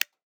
Sound effects > Human sounds and actions
A clean, mechanical switch sound featuring a quick click followed by a subtle snap, ideal for toggles, buttons, or power controls.